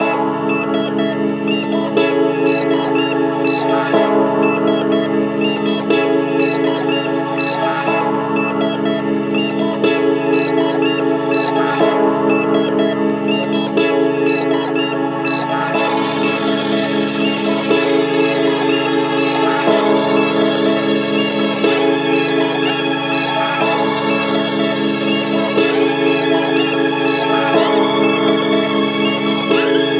Music > Multiple instruments
Trap and Drill melody - "Game Time" - Ab minor - 122bpm
Hopefully you can make a beat out of this cause I couldn't get a grip on it but it sounds sick